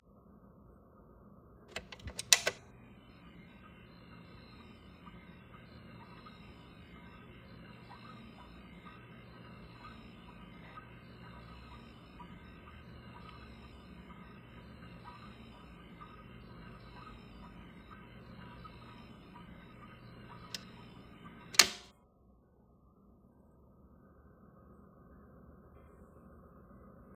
Sound effects > Objects / House appliances
Console Turntable Turned On and Off
A stereo console record turntable is turned on, runs and then is turned off.